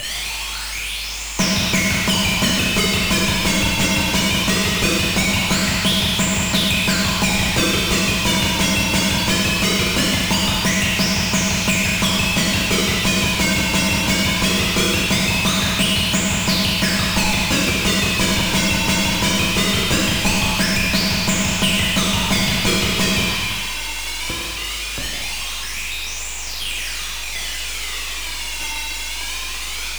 Music > Solo percussion
Simple Bass Drum and Snare Pattern with Weirdness Added 028
Interesting-Results, Bass-and-Snare, FX-Laden, Simple-Drum-Pattern, Noisy, Fun, Experimental-Production, Silly, Experiments-on-Drum-Beats, Four-Over-Four-Pattern, Bass-Drum, Glitchy, FX-Drum-Pattern, Experimental, Experiments-on-Drum-Patterns, FX-Drum, Snare-Drum, FX-Drums, FX-Laden-Simple-Drum-Pattern